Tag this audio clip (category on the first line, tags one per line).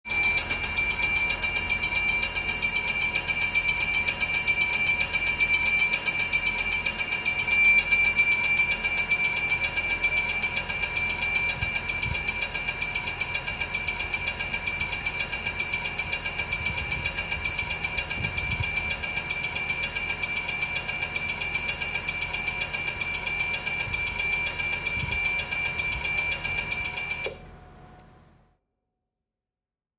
Soundscapes > Urban
platform
arriving
departure
railway
train
chime
Bell
station
trill
alarm